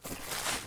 Sound effects > Human sounds and actions
Walking in the forest Location: Poland Time: November 2025 Recorder: Zoom H6 - SGH-6 Shotgun Mic Capsule